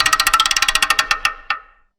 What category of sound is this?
Sound effects > Objects / House appliances